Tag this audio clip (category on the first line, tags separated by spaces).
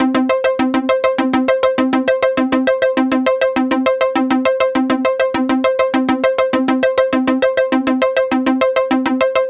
Music > Solo instrument
80s Analog Analogue Brute Casio Electronic Loop Melody Polivoks Soviet Synth Texture Vintage